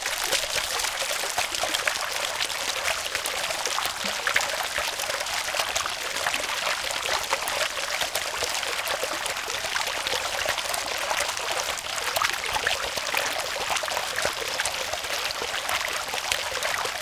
Sound effects > Natural elements and explosions
250710 20h25 Esperaza Place de la laïcité - NW edge -Close-up of Top of eastern tower fountain - MKE600
Subject : Recording the fountain from Place de la laicité in Esperaza. Here focusing on the top of the Eastern tower. Sennheiser MKE600 with stock windcover P48, no filter. Weather : Processing : Trimmed in Audacity.
field-recording, Aude, Sennheiser, MKE600, output, Juillet